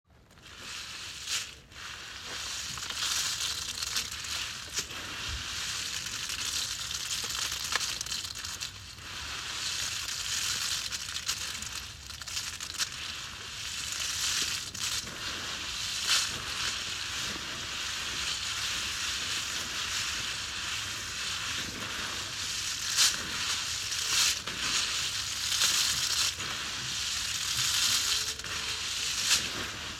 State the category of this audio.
Soundscapes > Nature